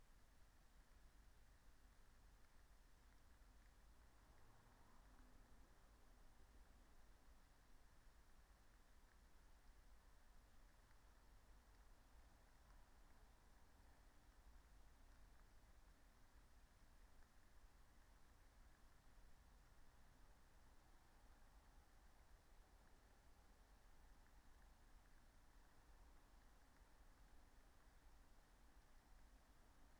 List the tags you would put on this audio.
Soundscapes > Nature
data-to-sound
alice-holt-forest
artistic-intervention
raspberry-pi
phenological-recording
modified-soundscape
nature
soundscape
weather-data
sound-installation
natural-soundscape
field-recording
Dendrophone

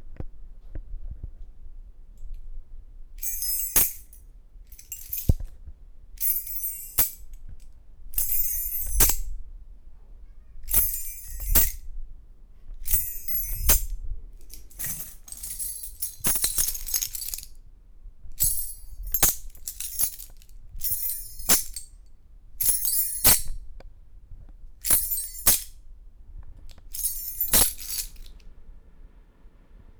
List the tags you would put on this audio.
Sound effects > Objects / House appliances
key
keys

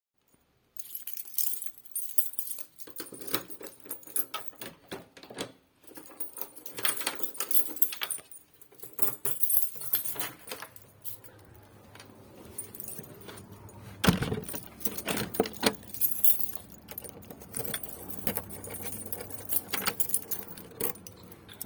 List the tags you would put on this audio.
Sound effects > Objects / House appliances
close
closing
door
entering
gate
jingle
key
keychain
keys
lock
locking
open
opening

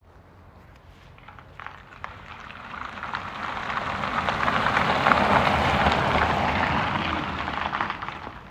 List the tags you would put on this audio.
Sound effects > Vehicles
vehicle
ev

